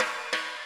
Music > Solo percussion
Snare Processed - Oneshot 176 - 14 by 6.5 inch Brass Ludwig
acoustic, beat, crack, drum, drumkit, fx, hit, hits, kit, ludwig, oneshot, perc, processed, realdrum, reverb, rimshot, rimshots, sfx, snares